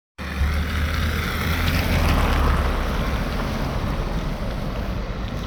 Soundscapes > Urban
Car with studded tires recorded on phone